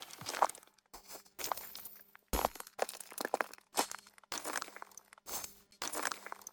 Sound effects > Human sounds and actions
Footsteps in wet gravel and mud 2
Footsteps on wet gravel and mud, recorded in the park.
gravel, step, steps, crunch, squish, splash, feet, footsteps, foot, rain, pebbles, walking, footstep, walk, mud